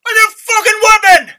Speech > Solo speech
Soldier-Need A weapon F weapon
Yelling for a flipping weapon
battle, vocal